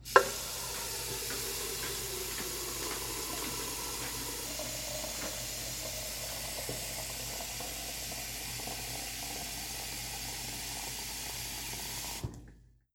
Sound effects > Natural elements and explosions
A sink water pouring into a kettle.